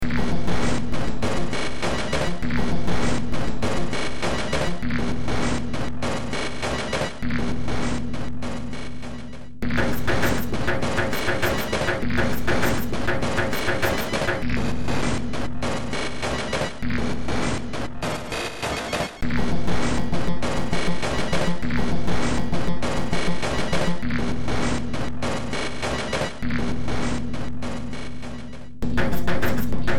Music > Multiple instruments
Short Track #3176 (Industraumatic)
Ambient, Sci-fi, Soundtrack, Underground, Noise, Horror, Games, Industrial, Cyberpunk